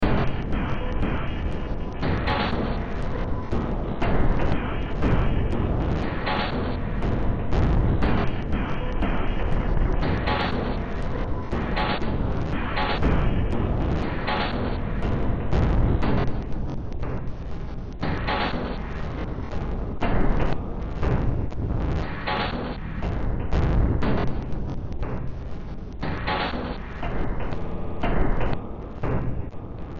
Music > Multiple instruments
Demo Track #3052 (Industraumatic)
Industrial, Soundtrack, Ambient, Cyberpunk, Noise, Games, Horror, Sci-fi, Underground